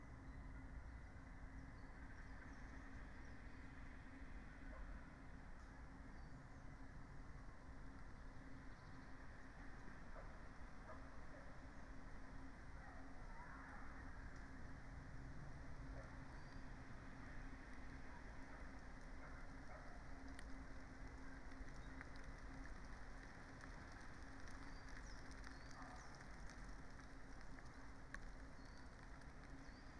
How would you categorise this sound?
Soundscapes > Nature